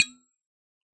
Sound effects > Objects / House appliances
Solid coffee thermos-013
percusive, sampling, recording